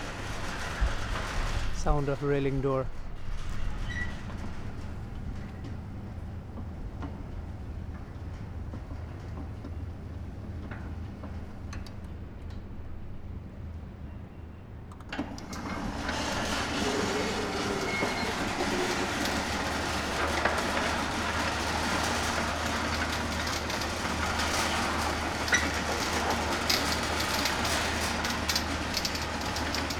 Sound effects > Other mechanisms, engines, machines
SFX-Metal,Railing,Door
Squeaky smooth metal railing automatic door opening or closing sound. Recorded with Sennheiser MKH-416 connected to Zoom F4 field recorder.
Metal, Railing, SFX, Smooth